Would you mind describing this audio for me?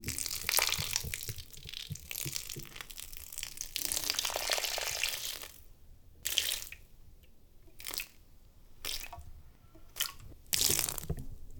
Sound effects > Objects / House appliances
Watering Can Pouring 2
Water pouring from a plastic watering can. Recorded with Rode NTG5.
water, liquid, pour, pouring, foley